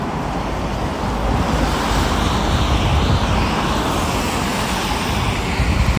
Sound effects > Vehicles
Car passing 12
drive, car, road, hervanta, tampere, outdoor, engine